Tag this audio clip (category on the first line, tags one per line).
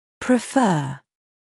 Speech > Solo speech
english
pronunciation
voice
word